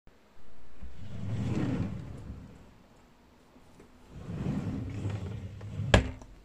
Sound effects > Objects / House appliances
opening and closing sound of a desk drawer
close, opening, desk, open, furniture, cabinet, sliding, drawer, kitchen